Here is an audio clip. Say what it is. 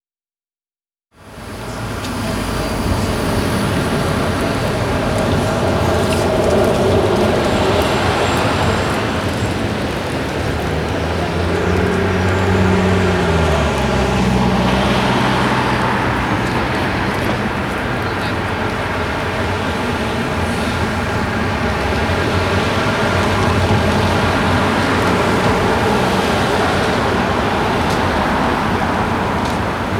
Soundscapes > Urban
High Street Evening Traffic
bus, recording, car, noise, soundscape, city, ambience, crossing, cars, ambient, field-recording, evening, roar, vroom, high, bustle, engine, field, traffic, road, street